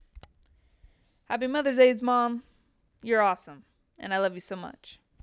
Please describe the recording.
Speech > Solo speech
A fast and energetic Mother's Day voice clip, ideal for short videos, story posts, or anywhere you need a quick burst of love. Script: "Happy Mother’s Day, Mom! You’re awesome and I love you so much!"